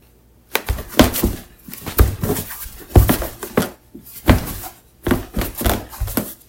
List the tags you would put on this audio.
Sound effects > Human sounds and actions
staggering
stumbling
tripping

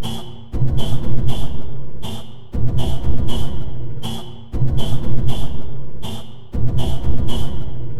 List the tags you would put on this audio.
Instrument samples > Percussion
Weird
Loopable
Industrial
Packs
Dark
Alien
Ambient
Underground
Samples
Drum
Soundtrack
Loop

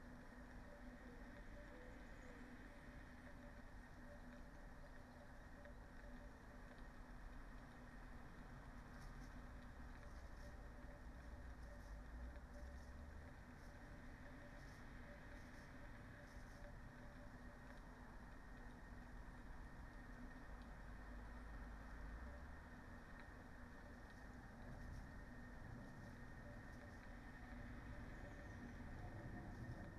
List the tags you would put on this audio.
Soundscapes > Nature
artistic-intervention
data-to-sound
modified-soundscape
nature
phenological-recording
soundscape

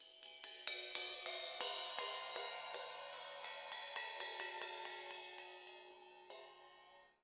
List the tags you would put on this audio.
Music > Solo instrument
Crash
Cymbal
Cymbals
Drum
Drumkit
Drums
Hat
kit
Metal
Metallic
Perc
Percussion
Ride

